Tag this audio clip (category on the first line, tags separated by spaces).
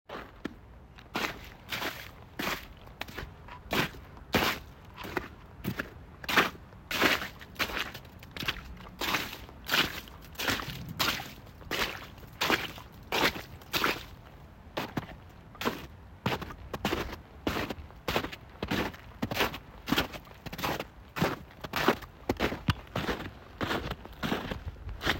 Sound effects > Human sounds and actions
Snow Outside Boots Walking Foley Footsteps Crunch